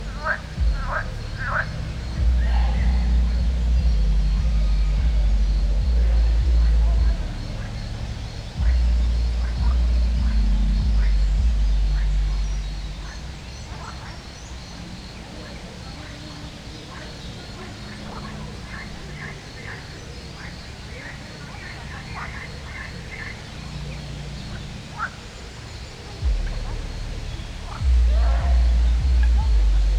Soundscapes > Nature
250515 21h06 Albi - Parc Pratgraussals lac - North
Subject : Recording a park in Albi called PratGraussal, which has a lake. Date YMD : 2025 05 15 (Thursday) 21h06 Location : Albi 81000 Occitanie France. Hardware : Tascam FR-AV2, Rode NT5 in a ORTF configuration with WS8 windshields. Weather : Processing : Trimmed and Normalized in Audacity. Probably some fade in/out.